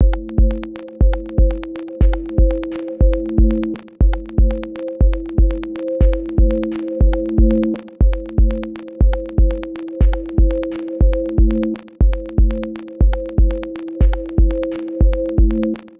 Music > Solo instrument
This is a drum loop created with my beloved Digitakt 2.